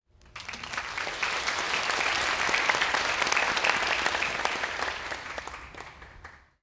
Sound effects > Human sounds and actions
CRWDApls Zoom H4e XY Applause in music hall SoAM
-23 LUFS Fade In/ Fade Out 0.3 ms Slightly RXed(you can't fill it) Record with Zoom H4e built-in XY mic system There coming a little upgrade of my recording system, so you will hear it in future)